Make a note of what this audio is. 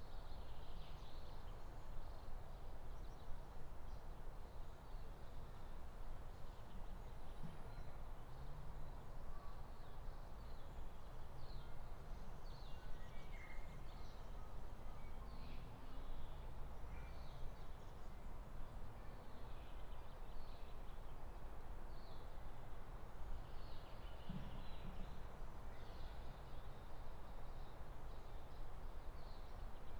Soundscapes > Urban
Recorded 15:06 24/12/25 In this ambience are mainly tuis and chaffinches, but also greenfinches, silvereyes, fantails etc. There’s some distant traffic and a playground where some drum sound comes from throughout the recording. Also two dog walkers, and a bit of wind in the middle. Zoom H5 recorder, track length cut otherwise unedited.